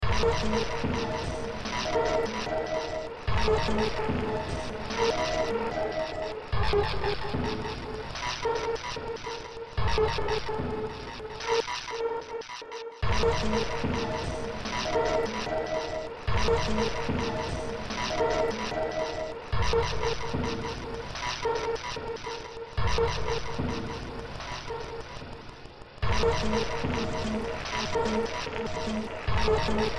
Music > Multiple instruments
Short Track #2978 (Industraumatic)
Cyberpunk, Horror, Sci-fi, Soundtrack, Underground